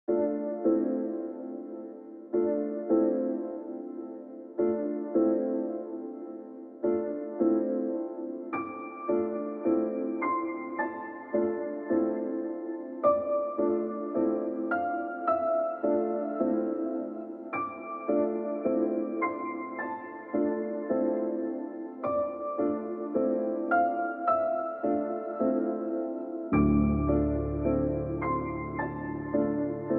Music > Multiple instruments
Inspired by the music from various mystery games I set out to create my own. This version uses a background piano as well as repeating drums to make it more suitable for background music. Failing to comply will result in your project, any type, being taken down.